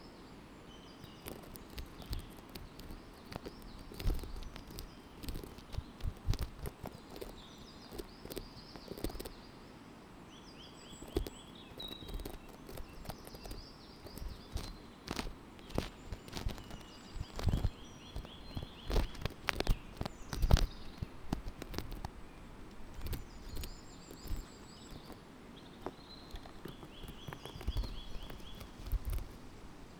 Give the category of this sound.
Soundscapes > Nature